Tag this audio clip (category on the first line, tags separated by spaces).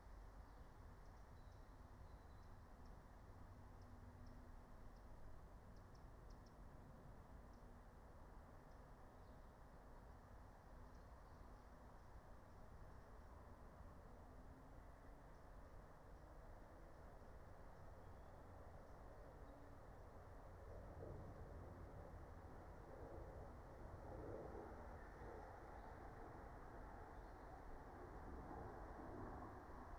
Soundscapes > Nature
natural-soundscape nature soundscape phenological-recording meadow alice-holt-forest raspberry-pi field-recording